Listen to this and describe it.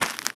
Sound effects > Human sounds and actions
SFX GravelCrunch3
Recorded on ZoomH1n and processed with Logic Pro.
crunch, soundeffect, gravel, foley